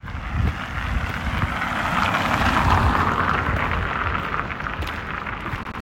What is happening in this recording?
Soundscapes > Urban
Car passing Recording 6
Transport, Cars, Road